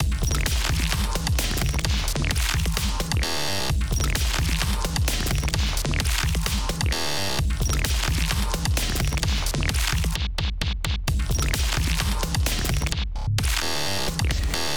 Music > Other
Glitch Loop 03
A designed glitch loop created in Reaper with a bunch of VST's.
digital, Glitch, synthetic, electronic, loop